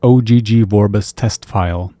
Speech > Solo speech
"Oh Gee Gee Vorbis Test File" spoken by yours truly. Recording: Shure SM7B → Triton FetHead → UR22C → Audacity, some compression and normalization applied.
voice, test, audio-file, english